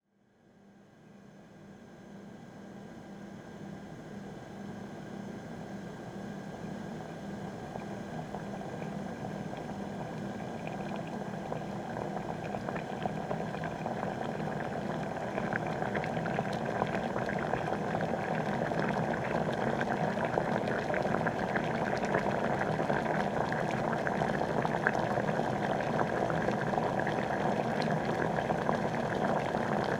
Soundscapes > Indoors
Boiling water on an induction stove
Boiling pot of water on an induction stove, balcony door open. Recorded on a Thronmax MDrill One USB microphone.
hum, bubbling, water, boiling